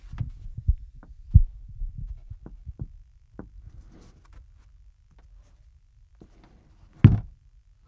Sound effects > Vehicles
Ford 115 T350 - Sunvisor
Subject : Recording a Ford Transit 115 T350 from 2003, a Diesel model. Date YMD : 2025 August 08 Around 19h30 Location : Albi 81000 Tarn Occitanie France. Weather : Sunny, hot and a bit windy Processing : Trimmed and normalised in Audacity. Notes : Thanks to OMAT for helping me to record and their time.